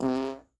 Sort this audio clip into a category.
Sound effects > Human sounds and actions